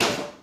Sound effects > Other
Metallic impact sound effect. Recorded with my phone.